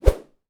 Sound effects > Natural elements and explosions
Stick - Whoosh 6
fast, FR-AV2, NT5, one-shot, oneshot, Rode, SFX, stick, Swing, swinging, tascam, Transition, whoosh, whosh, Woosh